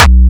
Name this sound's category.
Instrument samples > Percussion